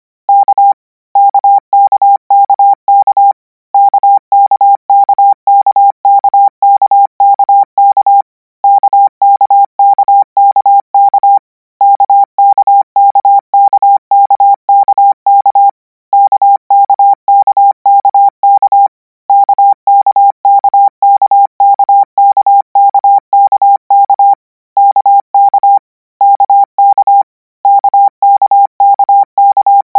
Sound effects > Electronic / Design
Koch 01 K - 200 N 25WPM 800Hz 90%
Practice hear letter 'K' use Koch method (practice each letter, symbol, letter separate than combine), 200 word random length, 25 word/minute, 800 Hz, 90% volume.